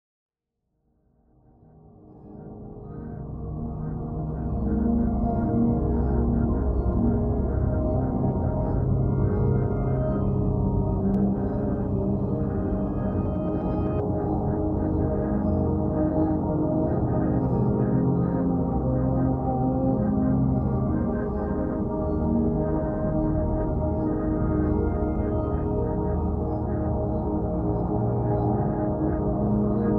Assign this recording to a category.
Music > Other